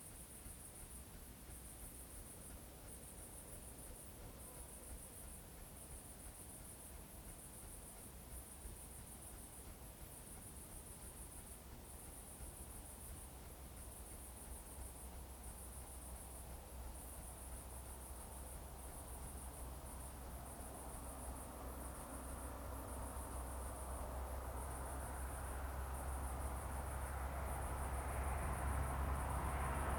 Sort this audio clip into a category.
Soundscapes > Nature